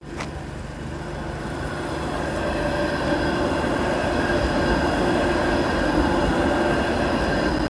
Sound effects > Vehicles
A tram passing by from distance on Insinöörinkatu 23 road, Hervanta aera. Recorded in November's afternoon with iphone 15 pro max. Road is wet.
rain, tampere, tram